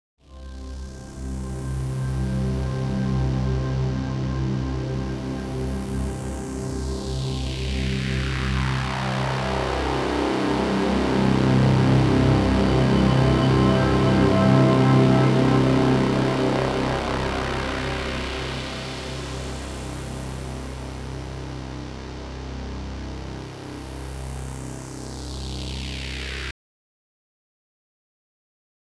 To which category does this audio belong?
Instrument samples > Synths / Electronic